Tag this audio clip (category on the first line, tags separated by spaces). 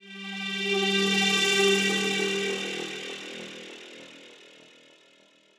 Sound effects > Electronic / Design
abstract effect efx electric fx psy psyhedelic psytrance sci-fi sfx sound sound-design sounddesign soundeffect